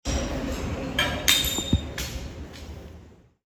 Soundscapes > Urban
A Glass Dropped Outdoors
Snippet from a field recording in Barcelona. Not perfect, but it makes for a funky loop I think.
bouncing, street, dropped, barcelona, shattered, broken, floor, dropping, breaking, fall, falling, glass, urban, shattering